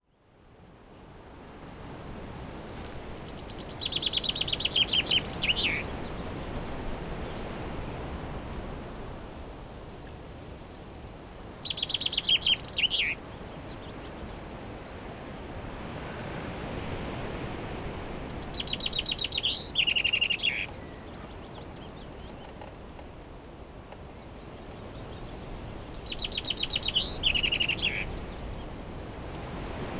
Soundscapes > Nature
A recording at RSPB Campfield Marsh.